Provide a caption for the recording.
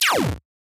Sound effects > Electronic / Design
Blaster pew
This sound was made and processed in DAW; High to low pitch squarey synth with added 'spice' to it; Laser gun, or blaster gun, i don't know. Sounds pew-pewy, so i hope you'll like it. I also have a better version, but this one is also cool :-). Ы.